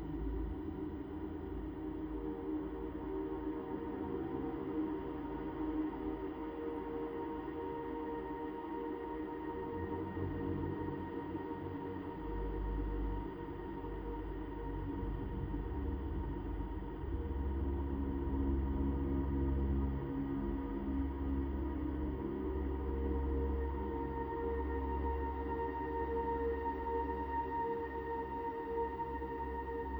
Soundscapes > Synthetic / Artificial
Horror Atmosphere 12 Mr Nefarious Loop
Horror Atmosphere Ambience - created by layering various field recordings and foley sounds and applying processing and effects. A readymade loop that can be extended to any length required, mixed with voiceover in mind.
Adversary, Bad-Intensions, Dark-Ambience, Dark-Atmosphere, Horror, Horror-Ambience, Horror-Atmosphere, Nefarious, Seamless-loop, Villain, Villainous